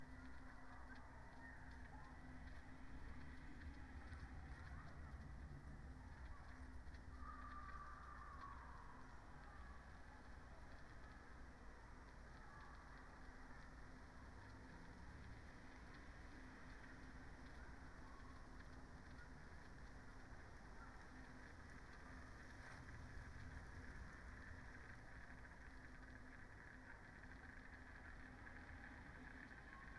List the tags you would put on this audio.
Soundscapes > Nature
raspberry-pi
natural-soundscape
phenological-recording
soundscape
field-recording
Dendrophone
nature
data-to-sound
sound-installation
artistic-intervention
weather-data
alice-holt-forest
modified-soundscape

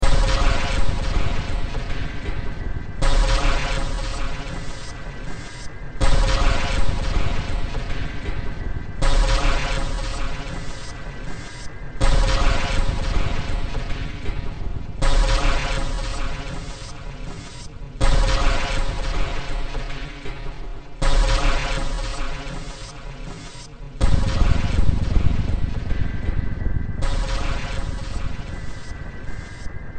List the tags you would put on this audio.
Music > Multiple instruments
Ambient
Underground
Industrial
Soundtrack
Sci-fi
Horror
Noise
Games
Cyberpunk

